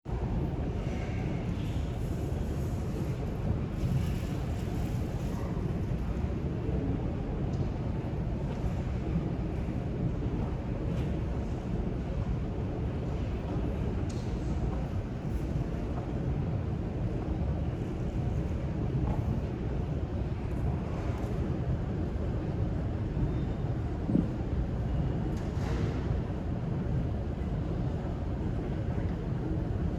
Soundscapes > Indoors
Recorded on September 2nd, 2025, in the Mujo Cafe, Duderstadt Center, on the University of Michigan's North Campus. Recorded on an iPhone 13 mini. Escalators, engineers, workers, walkers, conversationists, yet strangely quiet. Rather disproportionate to the amount of people present in the soundscape.